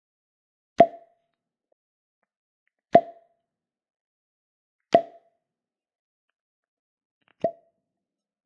Sound effects > Other
Removing Piston from Syringe foley
This is the sound of a the piston of a large syringe being pulled from its cylinder.